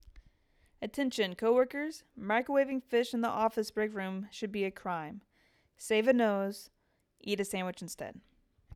Speech > Solo speech
Funny PSA – Don't Microwave Fish at Work
A hilarious PSA aimed at office etiquette — perfect for skits, comedy videos, or workplace jokes. Script: "Attention, coworkers: microwaving fish in the office breakroom should be a crime. Save a nose — eat a sandwich instead."
ComedyVoice, NoFishInMicrowave, OfficeLife, MicrowaveDisaster, PublicService, FunnyPSA, BreakroomRules